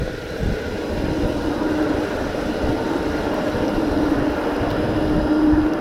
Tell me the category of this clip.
Sound effects > Vehicles